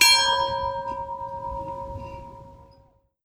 Sound effects > Other
BELLMisc-Samsung Galaxy Smartphone, CU Ship, Ringing Nicholas Judy TDC
A ship bell ringing. Recorded at Luray Valley Museums and Gardens.